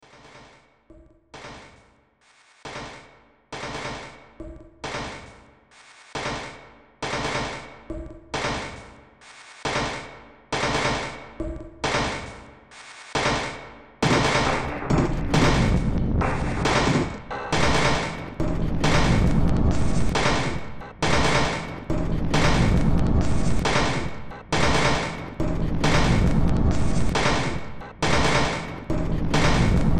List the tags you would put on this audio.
Music > Multiple instruments
Sci-fi
Horror
Underground
Games
Industrial
Soundtrack
Ambient
Noise
Cyberpunk

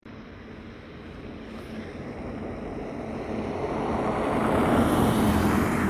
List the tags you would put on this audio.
Sound effects > Vehicles
car
engine
vehicle

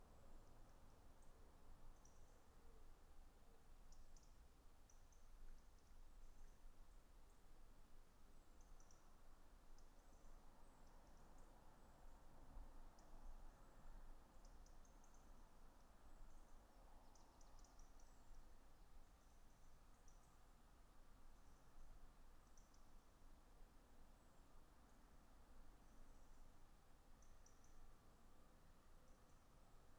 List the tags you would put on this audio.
Soundscapes > Nature
nature
phenological-recording
Dendrophone
data-to-sound
field-recording
weather-data
alice-holt-forest
modified-soundscape
natural-soundscape
soundscape
artistic-intervention
raspberry-pi
sound-installation

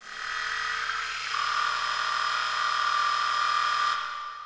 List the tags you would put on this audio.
Sound effects > Electronic / Design
Trippy
Buzz
Mechanical
Creature
Analog
Glitch
Spacey
Digital
Automata
Experimental
Creatures
Synthesis
Robotic

